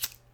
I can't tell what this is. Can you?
Sound effects > Other
LIGHTER.FLICK.9
Lighter, zippo, Flicks